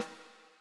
Music > Solo percussion
Snare Processed - Oneshot 109 - 14 by 6.5 inch Brass Ludwig
realdrum, drumkit, sfx, beat, reverb, snare, flam, drums, processed, oneshot, hit, roll, snares, fx, realdrums, rim, acoustic, brass, ludwig, percussion, perc, crack, hits